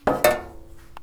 Sound effects > Other mechanisms, engines, machines
Woodshop Foley-080
bam, fx, little, perc, shop